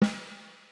Music > Solo percussion
Snare Processed - Oneshot 190 - 14 by 6.5 inch Brass Ludwig
roll, realdrum, sfx, perc, processed, drum, flam, drums, drumkit, snareroll, snaredrum, ludwig, brass, rimshots, crack, hits, hit, kit, percussion, reverb, oneshot, fx, snares, rim, snare, beat, rimshot, acoustic, realdrums